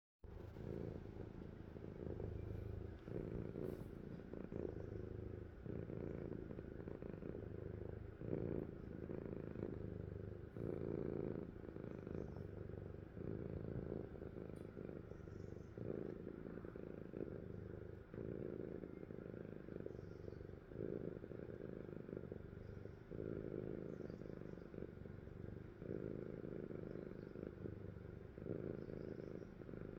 Sound effects > Animals
Loud cat purring strong motor like
Very loud and powerful cat purring, deep and motor-like. Recorded close to my own cat, with a smartphone microphone (Samsung Galaxy S22).
animal, deep, pet, purring